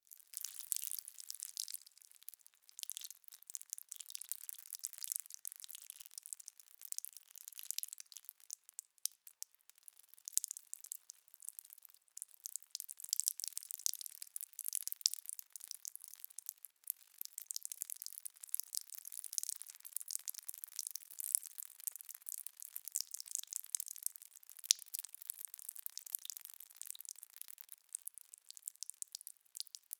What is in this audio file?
Sound effects > Other
Water dripping [Pee dripping - Cum dripping]
We're seeking contributors!